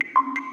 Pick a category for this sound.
Sound effects > Electronic / Design